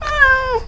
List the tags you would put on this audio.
Sound effects > Animals
feline MKE600 2025 MKE-600 meow Shotgun-mic Shotgun-microphone Cat Single-mic-mono female miaou middle-aged adult Tascam Sennheiser Hypercardioid July FR-AV2 animal

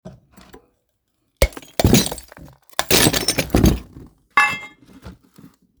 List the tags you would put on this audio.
Sound effects > Objects / House appliances
breaking ceramic pot smashing

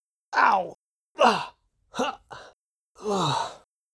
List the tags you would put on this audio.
Speech > Other
Ah Ow Exhaustion Damage Ha Extertion Leap

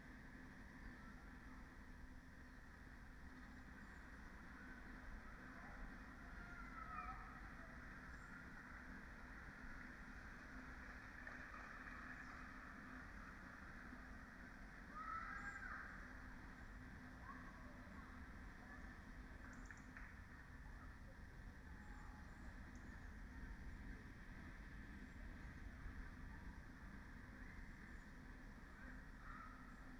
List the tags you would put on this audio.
Soundscapes > Nature
raspberry-pi sound-installation natural-soundscape data-to-sound modified-soundscape soundscape field-recording nature phenological-recording artistic-intervention weather-data Dendrophone alice-holt-forest

